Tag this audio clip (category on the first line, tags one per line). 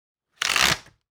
Sound effects > Objects / House appliances
calendar
office
paper
transition